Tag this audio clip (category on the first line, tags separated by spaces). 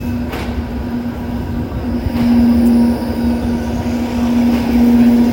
Sound effects > Vehicles
Tampere tram vehicle